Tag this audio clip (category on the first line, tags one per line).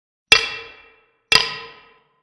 Sound effects > Other
bangs
blacksmith
clang
hammer
hit
impact
iron
metal
metallic
steel
strike
tool